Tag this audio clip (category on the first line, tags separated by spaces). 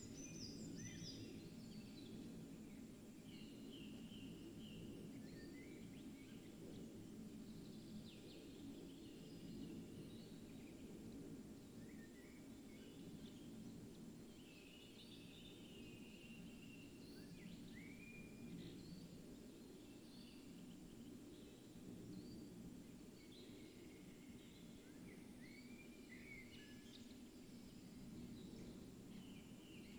Soundscapes > Nature
data-to-sound Dendrophone raspberry-pi soundscape nature alice-holt-forest artistic-intervention weather-data natural-soundscape sound-installation